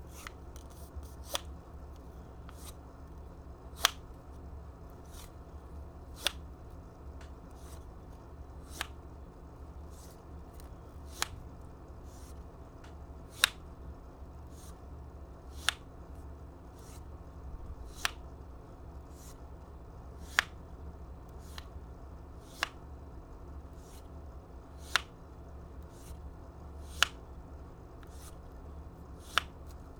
Sound effects > Objects / House appliances
TOYMisc-Blue Snowball Microphone, CU Pop Gun, Pumps, No Pop Nicholas Judy TDC
A pop gun pumps without a pop.
foley, Blue-brand, pump